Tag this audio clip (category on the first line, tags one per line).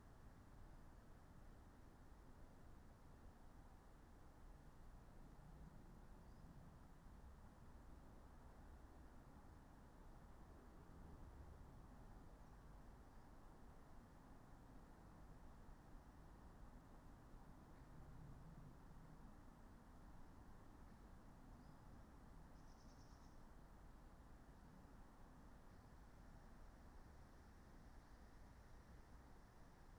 Soundscapes > Nature
phenological-recording
weather-data
raspberry-pi
soundscape
sound-installation
alice-holt-forest
data-to-sound
artistic-intervention
modified-soundscape